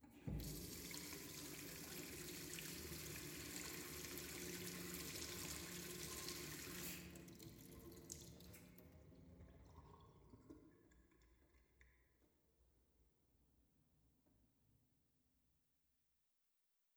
Sound effects > Objects / House appliances
WATRPlmb-Distant Sink, Turn On, Run, Off, Drain Nicholas Judy TDC
A sink turning on, running, turning off and draining in distance.